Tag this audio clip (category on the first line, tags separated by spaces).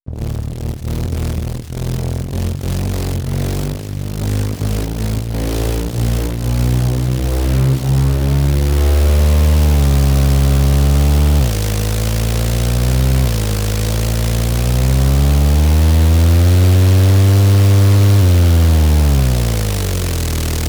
Sound effects > Vehicles
drive vehicle automobile revving car engine motor v8